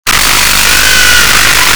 Sound effects > Experimental
NUKE (LOUD SOUND WARNING!)
its a bomb! (LOUD SOUND WARNING!)
explosion, nuclear, nuke, shockwave, tnt